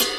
Instrument samples > Percussion
bellride weak 2 brief
Istanbul-Agop, Paiste, cymbal, bassbell, Mehmet, bellride, ping, Stagg, Crescent, cup, cymbell, Meinl, bell, Diril, bellcup, cupride, Hammerax, Bosphorus, Istanbul, Amedia, crashcup, Agean, ride, ridebell, click-crash, metal-cup, Zildjian, Sabian, Soultone